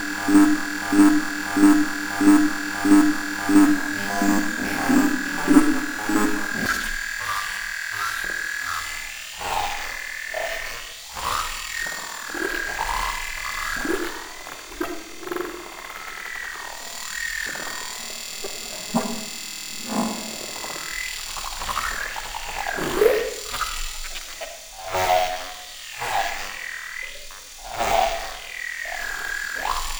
Sound effects > Electronic / Design
digital jungle sequence
Abstract, Droid, Otherworldly, Glitch, Noise, Automata, Analog, Mechanical, Spacey, Neurosis, Synthesis, Alien, Buzz, Trippin, Robotic, FX, Trippy, Creatures, Creature, Experimental, Digital, Drone